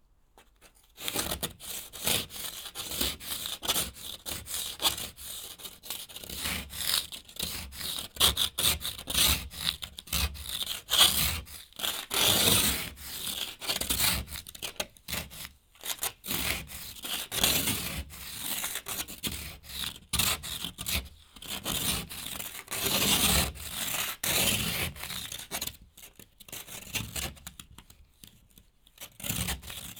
Sound effects > Human sounds and actions
The sound I heard whilst I was scraping ice from a car windscreen. I was wearing Roland in-ear microphones which gives a good stereo effect as I scrape from side to side. Zoom H1e with Roland in-ear microphones.
car, cold, frost, ice, safety, scraping, vehicle, vision, windscreen, windshield, winter